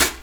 Sound effects > Other mechanisms, engines, machines
metal shop foley -160
tink, little, thud, bop, percussion, crackle, sfx, oneshot, metal, foley, sound, strike, perc, bang